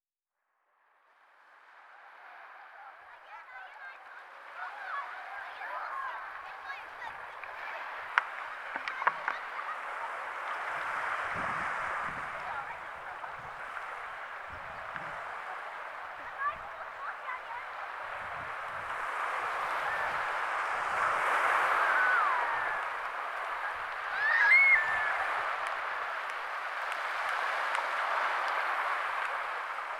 Soundscapes > Urban
Sabado en Praia do Almirante Reis - Madeira, Funchal
Soundscape recording of Rounded Pebble Praia do Almirante Reis - Madeira, Funchal Recorded with a TASCAM DR-40
field-recording, waves, sea, coast, beach, people, madeira